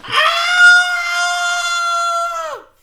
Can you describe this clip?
Sound effects > Human sounds and actions
horror type scream
scream, voice